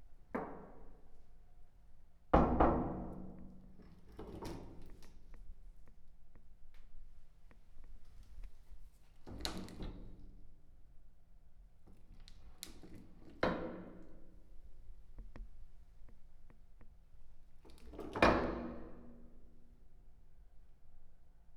Sound effects > Objects / House appliances
Bomb shelter door closing
In the basement of our apartment building, there is a bomb shelter with heavy metal doors, kind of like submarine doors. This is the sound of it closing.
shelter, doors, opening, door, metal, heavy, closing